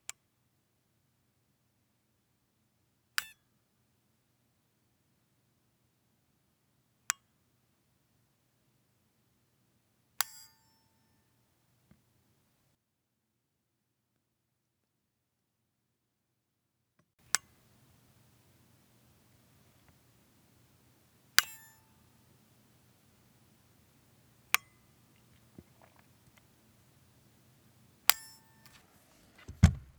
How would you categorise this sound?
Sound effects > Other mechanisms, engines, machines